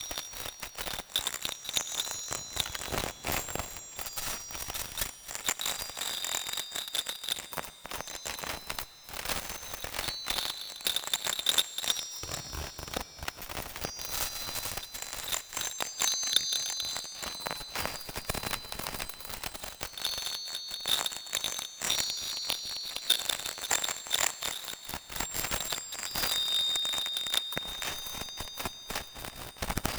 Soundscapes > Synthetic / Artificial
Crickets with Bells in the Background Texture
Crickets and bells generated with a granular synthesizer (Torso S4) starting from a sample of a vynil cracking
crickets, granular, nature, synthetic